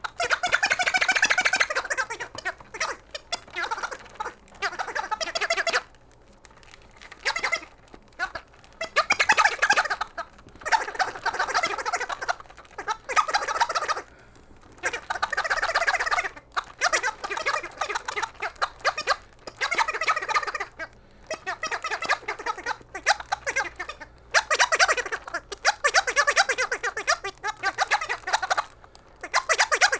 Sound effects > Objects / House appliances
TOONVox-Blue Snowball Microphone, CU Weird Talking Devices Nicholas Judy TDC
Weird talking devices.
Blue-brand, Blue-Snowball, cartoon, device, jabba, jibba, talking, weird